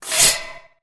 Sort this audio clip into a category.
Sound effects > Objects / House appliances